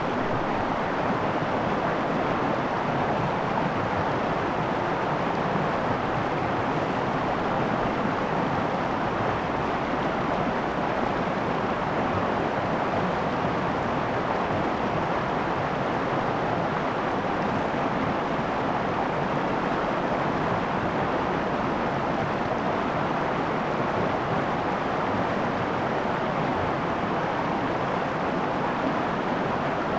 Sound effects > Natural elements and explosions
River Pöhla / Fluss Pöhla
The River Pöhla between Brettmühle and Königswalde in Germany.
rush, flowing, water, river, field-recording, rushing, flow